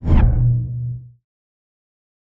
Sound effects > Other
Sound Design Elements Whoosh SFX 047
production, fx, sweeping, swoosh, dynamic, elements, element, sound, motion, effects, ambient, trailer, effect, whoosh, audio, cinematic, film, design, movement, transition, fast